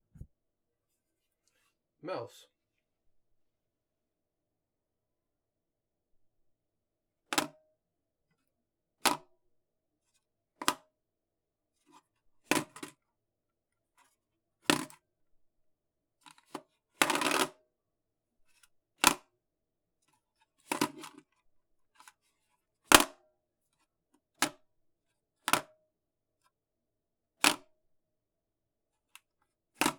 Sound effects > Objects / House appliances
Picking up and dropping a computer mouse on a countertop.